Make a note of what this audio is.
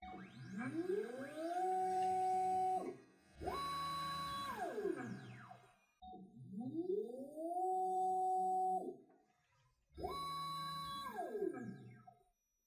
Sound effects > Other mechanisms, engines, machines
Motors Linear Actuator Medium Sized
The sound of a medium sized linear actuator from a resin 3D printer. This screw shaped mechanism pushes a roughly face sized platform up and down. The first sound of each cycle is retracting and the second sound is it extending. This sound contains two versions. The “dirty version” has accurate reverb and harmonization with the inside of the plastic casing of the machine. The “clean version” has most extraneous sounds excised, allowing for easier audio mixing with other sounds at the cost of sounding muffled on its own.